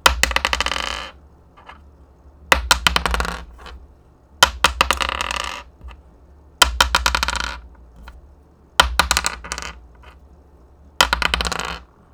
Sound effects > Objects / House appliances
METLImpt-Blue Snowball Microphone, CU Bolt, Drop Nicholas Judy TDC
A bolt drop.